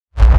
Instrument samples > Synths / Electronic
Hardstyle Rumble 2-Cut Off
Sample used Grv Kick 26 from FLstudio original sample pack. Processed with Fruity Limiter and Misstortion.
Bass, Distorted, Gatedkick, Hardcore, Hardstyle, PvcKick, rawstyle, Rumble